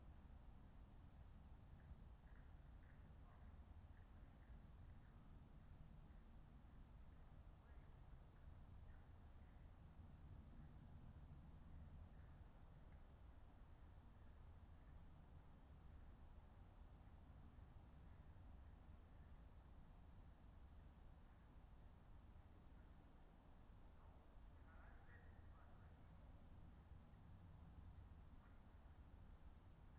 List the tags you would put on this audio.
Soundscapes > Nature
sound-installation
modified-soundscape
artistic-intervention
weather-data
nature
alice-holt-forest
field-recording
phenological-recording
soundscape
Dendrophone
raspberry-pi
natural-soundscape
data-to-sound

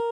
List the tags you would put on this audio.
Instrument samples > String
stratocaster
arpeggio
design
cheap
sound
guitar
tone

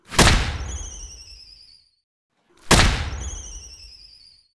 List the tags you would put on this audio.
Sound effects > Human sounds and actions
anime
attack
bang
boom
combat
decking
explosion
fate
fight
fighter
fighting
firecracker
hit
impact
kick
kicking
koyama
kung-fu
punch
punching
TMNT

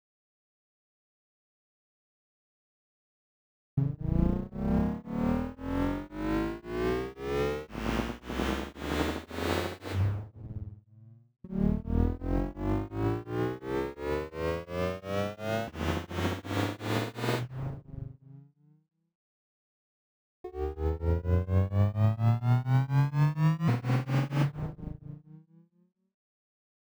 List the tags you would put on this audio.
Sound effects > Electronic / Design
electricity
increasing
laser
power
electronic
beam
charge
sci-fi
ascending
synth
device

